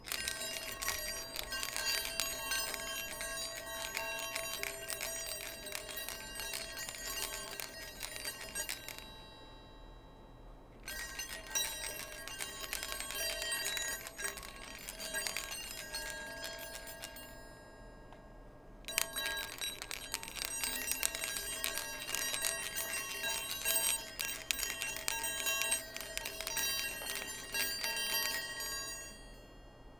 Sound effects > Objects / House appliances
BELLHand-Blue Snowball Microphone, CU Chinese Health Balls, Ringing Nicholas Judy TDC
Chinese health balls ringing.
baoding, Blue-Snowball, meditation, Blue-brand, baoding-balls, chinese-health-balls, balls, exercise, health, chinese